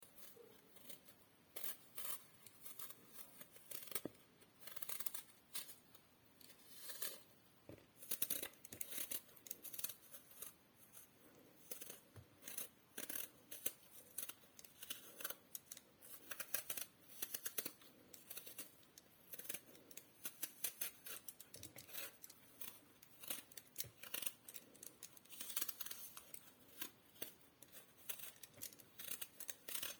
Sound effects > Other
Peeling Apples
Peeling an apple with an apple peeler
vegetable, apple, scraping, scrape, kitchen, peel, peeler, knife